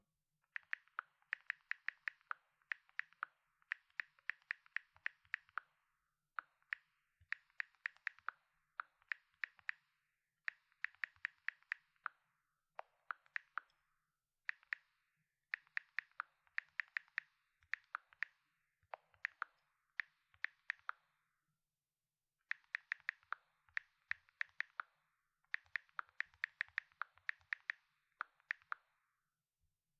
Sound effects > Electronic / Design
Someone typing on an iPhone. Phone. Typing. Apple. Sending a breakup text. Chatting with their bestie. Typing an idea for their next novel. Whatever you want. Enjoy! :) Recorded on Zoom H6 and Rode Audio Technica Shotgun Mic.
apple, text, phone, click, typing